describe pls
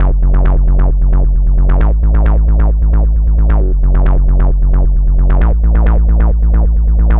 Music > Solo instrument
133 - Dmin - Start the fire Bassline
A bass sound created 2023 in bitwig studio.
bass, loop, Nativeinstruments, synthesizer